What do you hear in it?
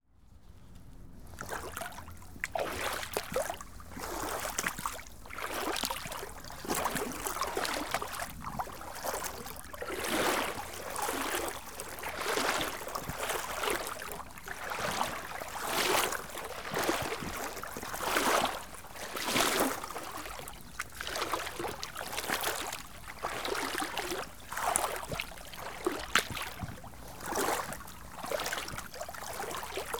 Soundscapes > Nature
A recording of me wading through water knee dip.
recording, Re, ambience, flowing